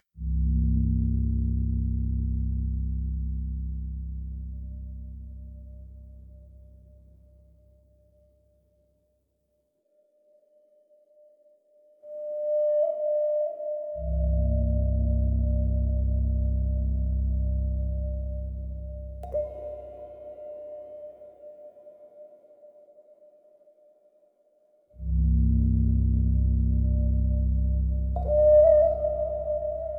Music > Multiple instruments
This is part of some experiments I am running to create atonal atmospheric soundscape using AI. This is more tuned towards tribal and organic sounds. AI Software: Suno Prompt: atonal, non-melodic, low tones, reverb, background, ambient, noise, tribal, organic